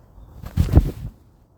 Sound effects > Human sounds and actions
Just recorded myself falling onto the grass with my phone